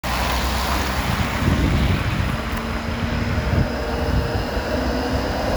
Soundscapes > Urban
A tram passing the recorder in a roundabout. The sound of the tram can along with sound of rain be heard. Recorded on a Samsung Galaxy A54 5G. The recording was made during a windy and rainy afternoon in Tampere.